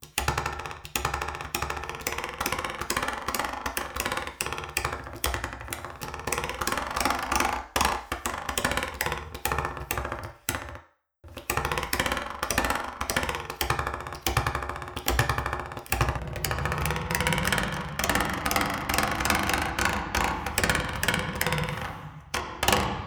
Sound effects > Other
Twang noise made with ruler on desk with added reverb.